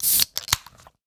Sound effects > Objects / House appliances
Opening a soda can. Gear: AVID Fast track Solo Sennheiser MKH 50